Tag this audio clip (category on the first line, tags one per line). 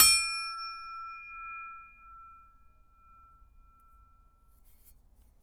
Sound effects > Other mechanisms, engines, machines
perc
oneshot
tink
tools
bang